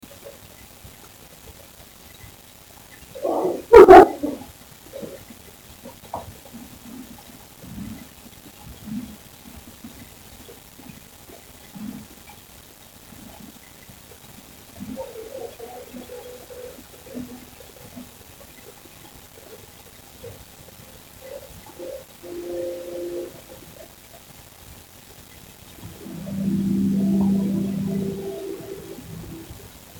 Soundscapes > Indoors
Night moaning. Netflix and Chills up stairs.
Little recording i made with my girl, good for cuckold games and themes. Simulating having sex up stairs, audio boosted. She got little bored at the end if the movie
female, moan, moaning, orgasm, sex